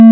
Sound effects > Electronic / Design
Just a computer beat